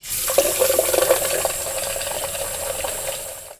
Sound effects > Objects / House appliances
It is a running water faucet.
running, water, faucet